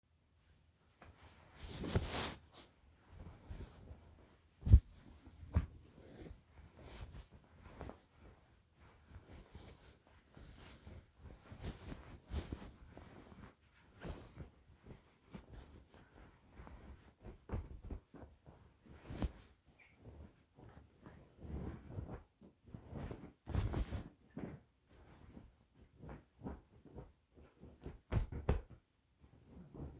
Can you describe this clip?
Sound effects > Objects / House appliances
The flapping around of comforter blankets and sheets. Could be used if someone is restlessly trying to sleep at night.
bed, cozy, blanket, rumaging, night, restlessly, muffled, bedtime, sleeping